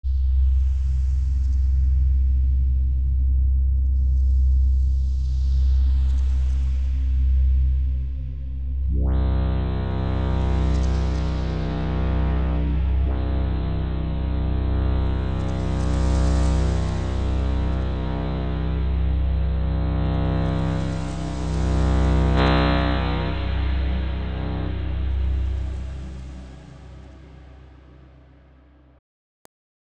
Instrument samples > Synths / Electronic
Deep Pads and Ambient Tones12
From a collection of 30 tonal pads recorded in FL Studio using various vst synths
Ambient, Analog, bass, bassy, Chill, Dark, Deep, Digital, Haunting, Note, Ominous, Oneshot, Pad, Pads, Synth, Synthesizer, synthetic, Tone, Tones